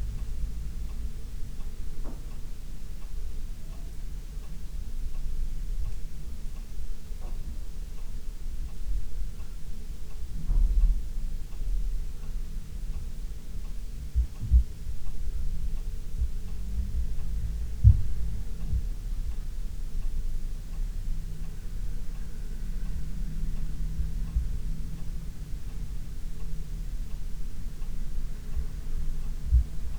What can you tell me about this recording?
Soundscapes > Indoors
OM-08 vs EM272 indoor - EM272 version
Indoor mic comparison : Rycote OM-08 vs Clippy EM272 – EM272 version. For my friend Nico and all of you who might be interested, here is a microphone comparison between Rycote OM-08 and Micbooster Clippy EM272. This is the EM272 version, recorded in a quiet bedroom of a modern flat, with double glazing windows, while kids were running upstairs,. The pulse noise is a Maneki Neko (Japanese cat doll moving its arms, symbolizing good fortune in several Asian cultures). Mics were placed about 36cm apart. Recorded with zoom H5Studio at its full gain.
Clippy, EM272, bedroom, Indoor, comparison